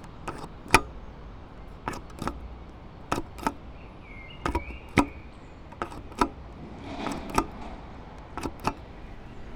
Sound effects > Objects / House appliances
250607 Albi - Street light crossing button
Subject : A button on street lights to activate the pedestrian crossing Date YMD : 2025 06 07. 05h43ish Location : Albi 81000 Taarn Occitanie France. Hardware : Tascam FR-AV2, Rode NT5 with WS8 windshield. Had a pouch with the recorder, cables up my sleeve and mic in hand. Weather : Grey sky. Little to no wind, comfy temperature. Processing : Trimmed in Audacity. Other edits like filter, denoise etc… In the sound’s metadata. Notes : An early morning sound exploration trip. I heard a traffic light button a few days earlier and wanted to record it in a calmer environment.
Mono, FR-AV2, Tascam, Outdoor, Occitanie, hand-held, Single-mic-mono, morning, 2025, Albi, click, Early, traffic-light, Wind-cover, France, Rode, City, WS8, 81000, Tarn, NT5, press, button, Early-morning, handheld